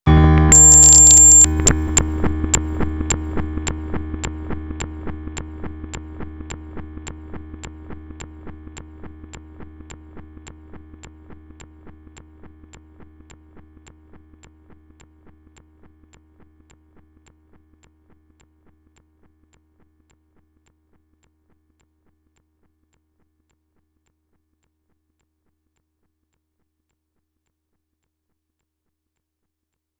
Instrument samples > Piano / Keyboard instruments
Broken Yamaha E-Piano D#
Broken Yamaha electric piano with tone E going crazy glitching.